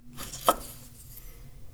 Sound effects > Other mechanisms, engines, machines
Dewalt 12 inch Chop Saw foley-029
Blade, Chopsaw, Circularsaw, Foley, FX, Metal, Metallic, Perc, Percussion, Saw, Scrape, SFX, Shop, Teeth, Tool, Tools, Tooth, Woodshop, Workshop